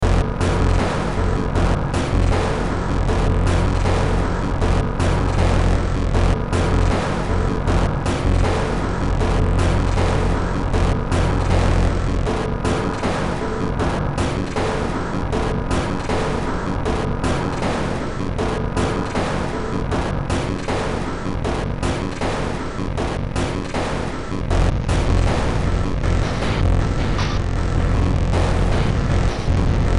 Music > Multiple instruments
Demo Track #3156 (Industraumatic)
Ambient, Cyberpunk, Games, Horror, Industrial, Noise, Sci-fi, Soundtrack, Underground